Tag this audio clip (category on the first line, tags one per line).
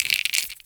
Sound effects > Objects / House appliances
bottle
pills
drugs
meds
pill
doctors
docs
medicine